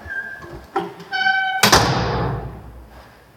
Sound effects > Objects / House appliances
Big metal door slamming shut with a squeak. Recorded with my phone.
closing, squeak, squeek